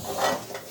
Instrument samples > Percussion
Recorded in Ricardo Benito Herranz Studio
metallic, percussive